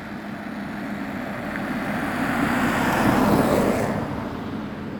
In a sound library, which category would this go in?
Sound effects > Vehicles